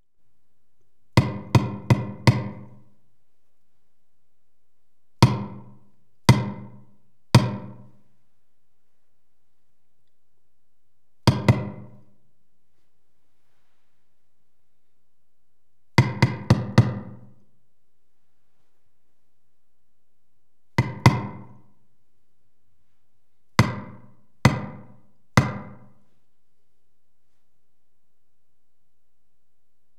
Sound effects > Other
Ghost knocking on door
Early morning. Resident sleeping peacefully. Disturbed by hammering on the front door. Ghost hitting door with pistol butt. Looking for her unfaithful husband. Different speeds of knocking so users can set pace by selecting individual knocks. Recorded with an H4n plus external mic. An antique pistol butt knocked against a piece of wood on the floor next to a door. Knocking directly on the door produced spurious additions - like the vibrations of the lock. A small amount of reverb added when processed with Sound Forge.
Heard-interior, Knocking-on-wooden-door, Ghost-outside-door-wanting-to-be-let-in